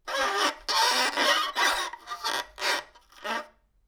Instrument samples > String
Bowing broken violin string 11
broken, uncomfortable, bow, strings, beatup, violin, unsettling, horror, creepy